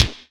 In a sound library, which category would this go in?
Instrument samples > Percussion